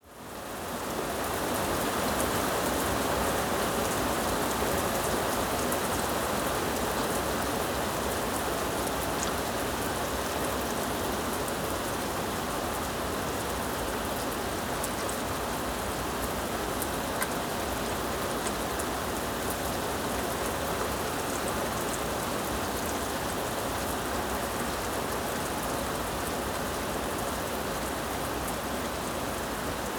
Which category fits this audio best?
Soundscapes > Nature